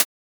Instrument samples > Synths / Electronic
A hi-hat one-shot made in Surge XT, using FM synthesis.